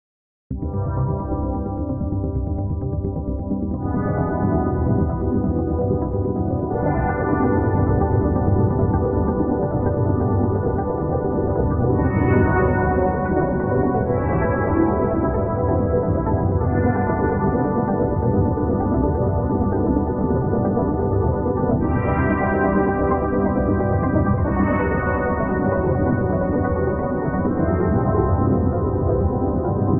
Soundscapes > Synthetic / Artificial
This is a soundscape made on Fl Studio with the plugin called sytrus with the preset "Borealis" on the C Scale. It would fit perfect for a sci fi scene from outer space, or a experimental visuals.